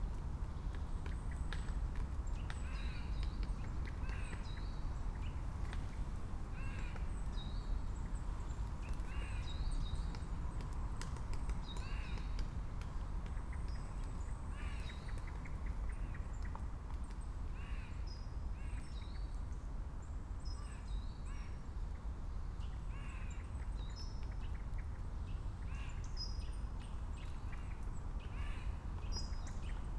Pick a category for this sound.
Soundscapes > Other